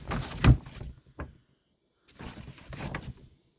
Sound effects > Objects / House appliances
OBJBag-Samsung Galaxy Smartphone, CU Paper, Place Apple or Item, Remove Nicholas Judy TDC
Placing an apple or item in and removing it from paper bag.
apple, item, paper, place